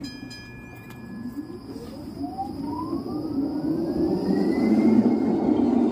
Soundscapes > Urban
final tram 15
hervanta, finland